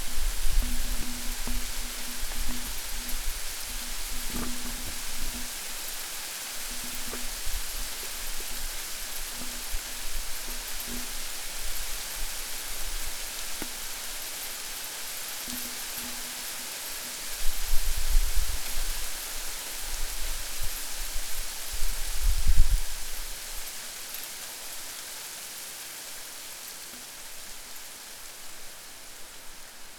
Sound effects > Natural elements and explosions
I recorded this sound with a Roland mic. There was hail outside, and I safely recorded it under an overhang, before it stopped, which you can hear in the audio.
California, Hail, Night, Rain, Santa-Cruz, Storm, Weather